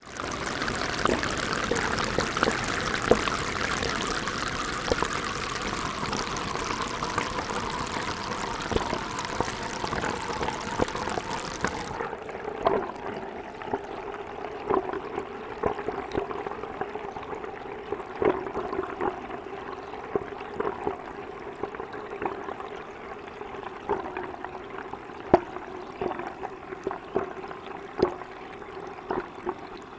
Sound effects > Objects / House appliances
Gladys Hydrophone recording of water pouring into a bathroom sink from a tap at various flow rates including draining water from the sink.